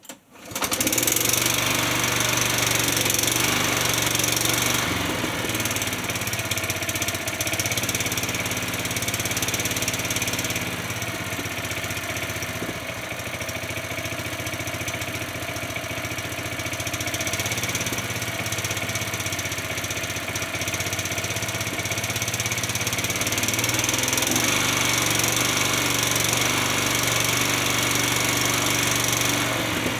Sound effects > Other mechanisms, engines, machines
ohv gov over take1
Small OHV motor starting and holding varying RPMs. Not a very good take as it was raining outside and i had to run the thing in the garage. I'll retry outside later. Recorded with my phone.
shut-off, rev, starting, four-stroke, motor, stop, shutoff, run, lawnmower, fourstroke, idle, start, lawn-mower, rev-hold, machine, revving